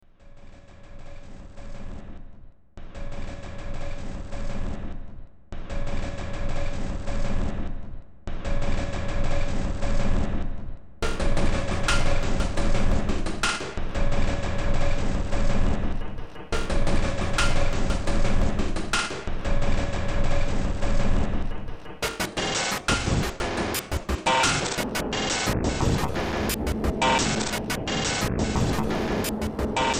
Music > Multiple instruments
Demo Track #3887 (Industraumatic)
Soundtrack, Horror, Noise, Underground, Games, Cyberpunk, Industrial, Ambient, Sci-fi